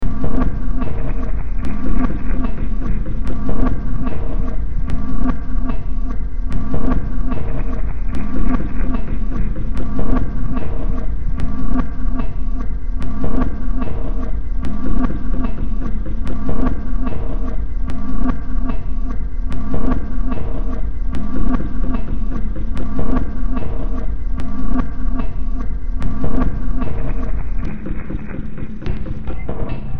Music > Multiple instruments
Demo Track #3196 (Industraumatic)
Games, Horror, Industrial, Sci-fi, Soundtrack